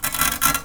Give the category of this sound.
Sound effects > Other mechanisms, engines, machines